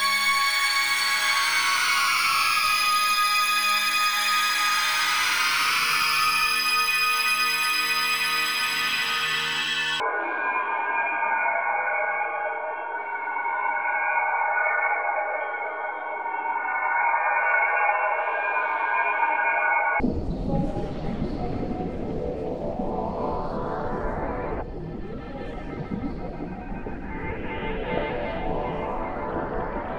Instrument samples > Synths / Electronic
Morphagene Ambient - MG Fantasy 03
Ambient sounds for Morphagene. Pads and space.
pad, Ambient, Morphagene